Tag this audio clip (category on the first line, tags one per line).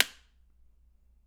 Sound effects > Objects / House appliances
metal
foley
object
glass
clunk
industrial
foundobject
mechanical
stab
bonk
hit
fx
natural
percussion
drill
sfx
oneshot
perc